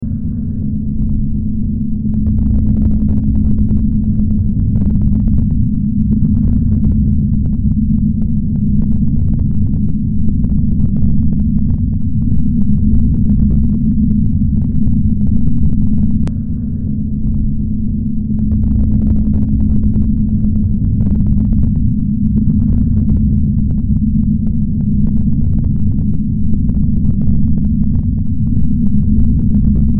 Music > Multiple instruments
Demo Track #3396 (Industraumatic)
Horror, Sci-fi, Cyberpunk, Ambient, Underground, Games, Industrial, Soundtrack